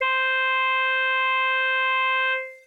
Instrument samples > Synths / Electronic
Saxophone (FM)
Made in famistudio. For use in music.
Sample, Saxophone, Electronic